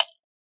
Sound effects > Objects / House appliances
Jewellerybox Shake 5 Shaker
Shaking a ceramic jewellery container with the contents inside, recorded with an AKG C414 XLII microphone.
jewellery, jewellery-box, trinket-box